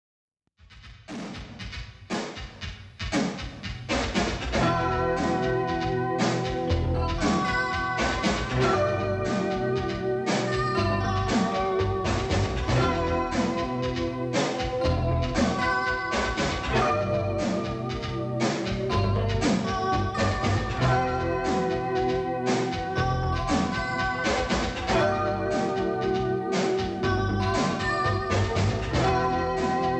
Music > Multiple instruments
Something like the opening song to a late night broadcast. Fender guitar going into a few reverbs, over top of a drum machine. Playing through a Panasonic tape deck, normal bias tape. Retro news broadcast song snippet.